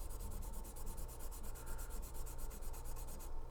Sound effects > Objects / House appliances
FOLYMisc-Blue Snowball Microphone Eraser, Erasing Nicholas Judy TDC
An eraser erasing.
Blue-brand, Blue-Snowball, erase, eraser, foley